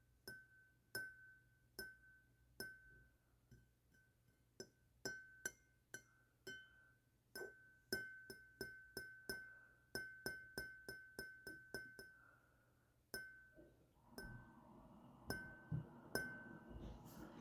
Sound effects > Objects / House appliances
GLASImpt-Samsung Galaxy Smartphone, MCU Taps Nicholas Judy TDC
Glass vase taps.
foley, glass, Phone-recording, tap, vase